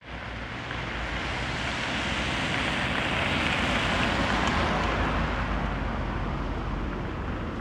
Soundscapes > Urban
Car driving by recorded in an urban area.
car, traffic, vehicle